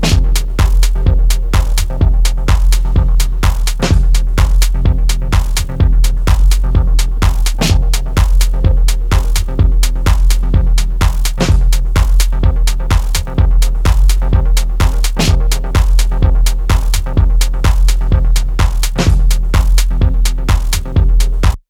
Music > Multiple instruments
Quick House Loop 88BPM
Made in FL11, used basic samples and an EmulatorX Vst
beat, house, idea, loop, quick, simple